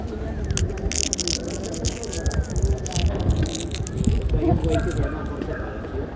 Sound effects > Human sounds and actions
chew crispy fried potato